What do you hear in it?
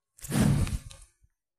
Sound effects > Objects / House appliances
Waving a tube sound Recorded with phone mobile device NEXG N25
Tube, waving, Wave, Hush